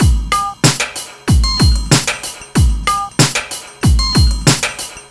Music > Other
hip hop snare 94 bpm
drumloop, drums, rhythm